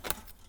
Sound effects > Other mechanisms, engines, machines
metal shop foley -167
bang, tools, knock, little, oneshot, boom, thud, pop, crackle, percussion, metal, shop, foley, perc, bam, sfx, tink, sound, strike, wood, rustle, bop, fx